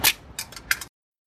Sound effects > Objects / House appliances
recorded on: hyperx quadcast edited on: audacity, noise cancelling and trimming i recorded this at my university garden -alara kanat